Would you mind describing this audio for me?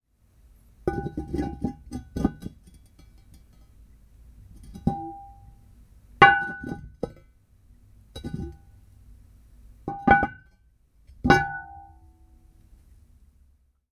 Sound effects > Objects / House appliances
FOODKware Cinematis KitchenAccessories CeramicPot CeramicTop Put Remove Big 02 Freebie
Placing and removing a large ceramic lid on a ceramic pot. This is one of several freebie sounds from my Random Foley | Vol. 4 | Pots & Containers pack.
Close, Ceramic, PostProduction, Effects, Big, SFX, Open, Handling, Sound, Foley, Recording, Freebie, Zoom